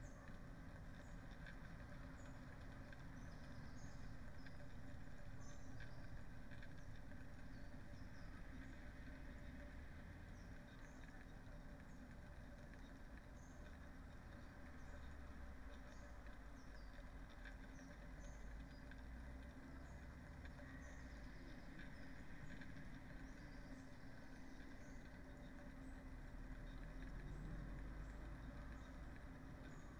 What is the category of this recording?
Soundscapes > Nature